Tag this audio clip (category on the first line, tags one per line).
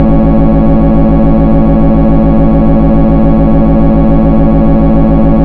Sound effects > Other mechanisms, engines, machines
2-stroke
v16
prime
railroad
motor
notch
freight
rail
diesel
emd
mover
engine
locomotive
railway
567
train